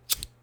Sound effects > Other
LIGHTER.FLICK.2
Lighter, Flicks